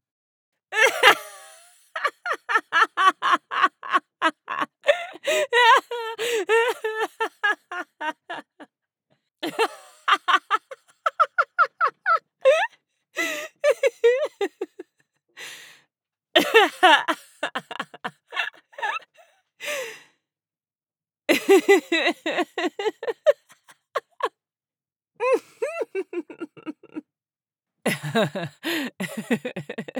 Sound effects > Human sounds and actions
Title: Happy Fun Laugh – Real Voice (No FX) Description: A natural, joyful human laugh recorded with warmth and clarity. Captured using a RØDE NT1 microphone, Focusrite Scarlett interface, and recorded in Adobe Audition, with no added effects.